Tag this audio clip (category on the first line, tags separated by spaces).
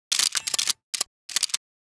Music > Multiple instruments
128 128-bpm 128bpm 870 beat click clicking firearm gun handling metal minimal music pump-action-shotgun remington touch weird